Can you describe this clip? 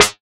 Instrument samples > Synths / Electronic
SLAPMETAL 4 Ab
bass, fm-synthesis, additive-synthesis